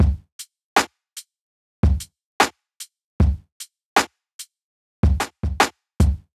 Instrument samples > Percussion
swinging funkny off-grid hip-hop drum loop (75bpm)
drum, drums, funky, hip-hop, loop, sample, swinging